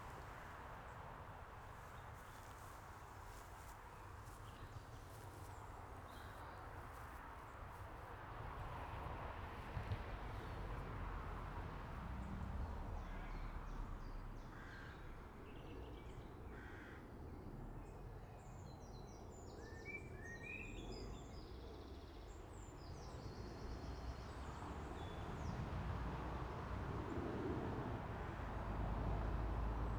Soundscapes > Nature
field recordind Whitegate chuchyard cheshire england 20-may-2025
Recorded in church yard of a Cheshire village 20-May-2025 at 2pm. Birdsong, trees, some wind, and also traffic from the nearby narrow road that cuts through the village.
ambience, birds, daytime, England, exterior, field-recording, nature, Village